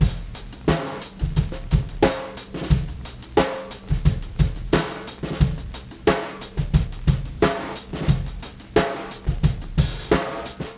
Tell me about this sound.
Music > Solo percussion
bb drum break loop sauce1 89
A short set of Acoustic Breakbeats recorded and processed on tape. All at 89BPM
Acoustic, 89BPM, Breakbeat